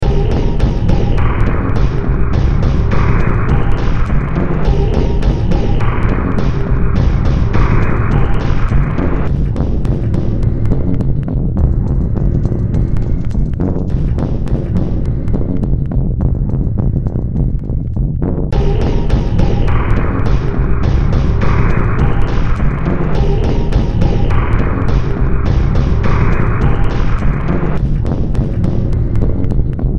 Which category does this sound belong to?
Music > Multiple instruments